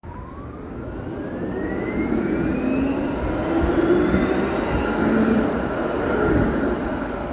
Sound effects > Vehicles
tram 10 accelerates from stop
A tram accelerated from a stop position on an almost-winter day. The sound was recorded in Hervanta, Tampere, using the built-in microphone of the Samsung Galaxy S21 FE. No special grea was used besides that; the recorder just simply tried his best to prevent noise. The sound was recorded to be used as a sample for a binary audio classification project.